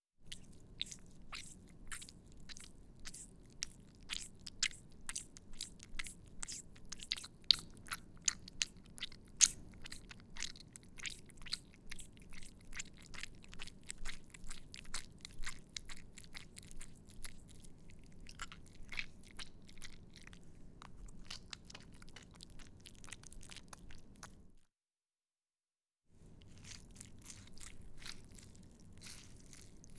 Sound effects > Human sounds and actions
squish comp 09 25
Simple squish sounds. There are 6 clips within this compilation; timestamps are as follows #0:00 -0:24 : Set 1, drier squish, longer runtime #0:26 -0:40 : Set 2, slower, medium pace, longer squishes #0:44 -1:19 : Set 3, longer deep squish, slower at first, gradually increases in speed #1:24 -1:30 : Set 4, rapid, quick squishes but very short runtime (my wrists began hurting) #1:34 -1:52 : Set 5, slower deep squish to begin, medium pace #1:54 -end : Set 6, slower squishes, gradually began speeding up, longerish ** All sounds heard here were recorded by using Tomatoes, water, papertowel, and a piece of rubber (yes it was messy, no I do not like how squished tomato feels between my fingers)